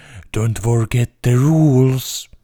Speech > Solo speech
dont forget the rules
Recorded with Rode NT1-A